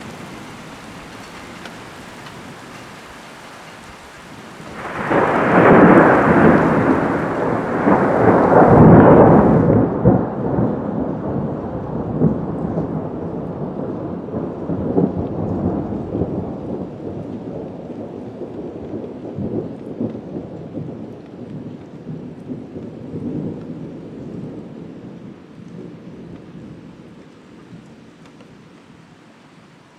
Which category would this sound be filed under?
Sound effects > Natural elements and explosions